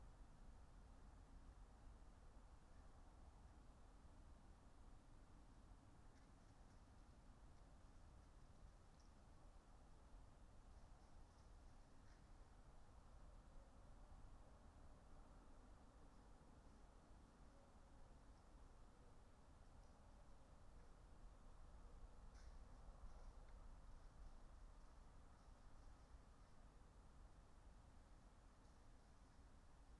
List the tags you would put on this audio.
Soundscapes > Nature
alice-holt-forest
Dendrophone
natural-soundscape
nature
raspberry-pi
soundscape

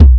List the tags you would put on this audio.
Instrument samples > Percussion
bongo
Premier
Sakae
Gretsch

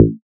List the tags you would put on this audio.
Instrument samples > Synths / Electronic
additive-synthesis
bass
fm-synthesis

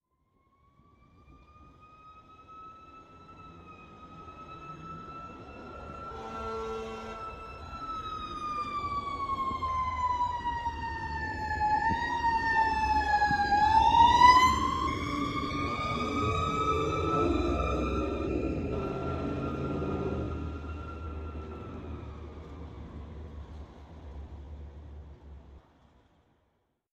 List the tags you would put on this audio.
Sound effects > Vehicles
siren
Phone-recording
fire-truck
pass-by
out
horn
wail
honk

